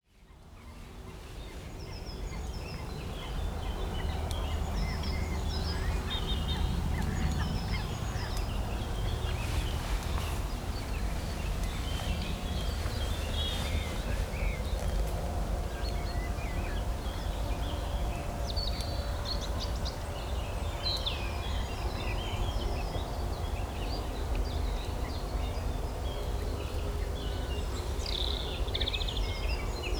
Soundscapes > Nature
A field recording at Blithfield Reservoir, Staffordshire. Morning time.